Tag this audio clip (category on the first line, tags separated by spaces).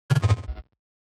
Sound effects > Electronic / Design
audio-glitch audio-glitch-sound audio-glitch-sound-effect computer-error computer-error-sound computer-glitch computer-glitch-sound computer-glitch-sound-effect error-fx error-sound-effect glitches-in-me-britches glitch-sound glitch-sound-effect machine-glitch machine-glitching machine-glitch-sound ui-glitch ui-glitch-sound ui-glitch-sound-effect